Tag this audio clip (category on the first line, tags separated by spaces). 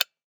Sound effects > Human sounds and actions
switch click off button activation interface toggle